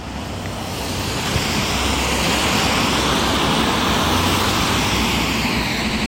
Sound effects > Vehicles
Car driving 5
engine, hervanta, outdoor, road